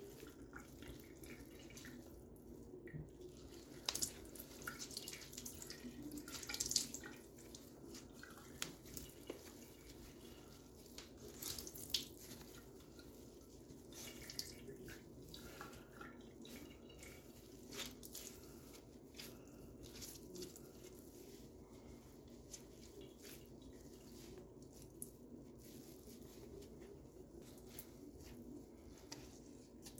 Sound effects > Natural elements and explosions
WATRDrip-Samsung Galaxy Smartphone, MCU Cloth, Wet, Wring Out Nicholas Judy TDC
Wet cloth wringing out with drips.
cloth, drips, out, Phone-recording, water, wet, wring